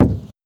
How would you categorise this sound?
Instrument samples > Percussion